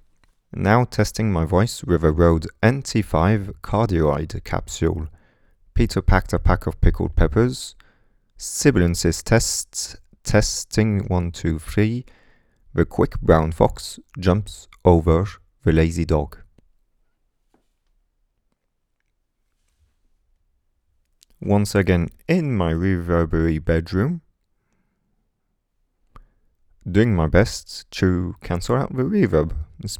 Speech > Solo speech
250728 Rode NT5 with pop filter speech test
Subject : Testing 3 microphones in my reverbery room (Sennheiser MKE-600, NT5 cardioid and omni capsule). At night with my house-mate sleeping and maybe a little ill so my voice isn't probably in it's best shape but tada. Date YMD : 2025 July 28 Location : Albi France. Rode NT5 Cardioid with a pop filter infront Weather : Processing : Trimmed and normalised in Audacity. Notes : Speaking in a corner, into a clothes closet (to minimise the reverb by absorbing it with the clothes and shield it from the room via the doors.).
microphone-test, hardware-testing, NT5, Male, pop-filter, voice, NT5-c, gear-testing, Rode, Cardioid, test